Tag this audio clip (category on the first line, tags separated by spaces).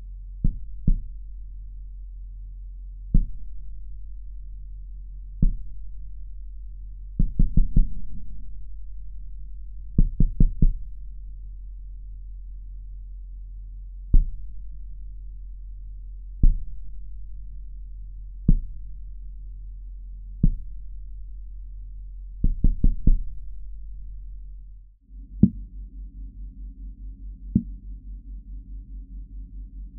Sound effects > Objects / House appliances
fridge refrigerator tap